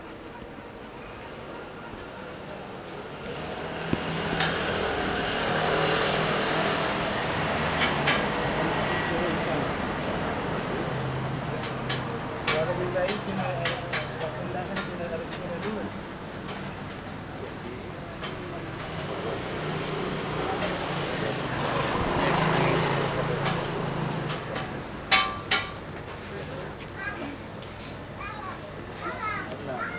Soundscapes > Urban
20251114 CarreteraCarmelCarrerCalderoBarca Cars Voices Commercial Noisy
Urban Ambience Recording in collab with Coves del Cimany High School, Barcelona, November 2026. Using a Zoom H-1 Recorder.
Cars, Commercial